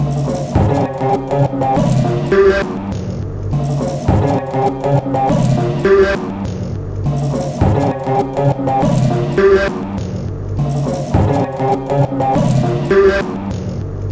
Instrument samples > Percussion
This 136bpm Drum Loop is good for composing Industrial/Electronic/Ambient songs or using as soundtrack to a sci-fi/suspense/horror indie game or short film.
Alien, Ambient, Dark, Drum, Industrial, Loop, Loopable, Packs, Samples, Soundtrack, Underground, Weird